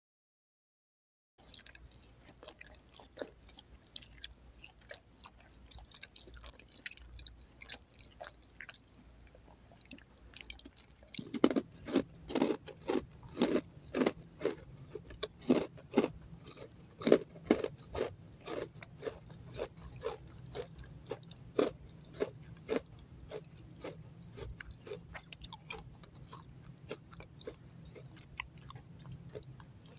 Sound effects > Human sounds and actions
Person eating and chewing food